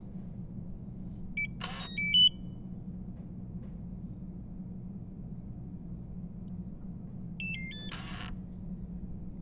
Sound effects > Other mechanisms, engines, machines
250828 110327 PH Electronic lock
Electronic lock, unlocking then relocking. Unlocking and locking the door of a rooms in Kingsfort Hotel Manila (Philippines). Quite a nice sound effect for a movie, isn’t it ? Recorded in August 2025 with a Zoom H5studio (built-in XY microphones). Fade in/out applied in Audacity.
Philippines, security, ambience